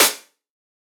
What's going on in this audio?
Instrument samples > Percussion
made with vital